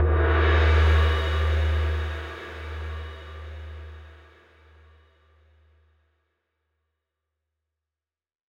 Sound effects > Other
Versus Gong
Thus begins the dramatic showdown. Made with FL Studio.
cinematic, cymbal, fight